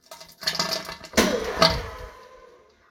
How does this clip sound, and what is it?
Sound effects > Other
Genuine outgoing poop recorded with smartphone.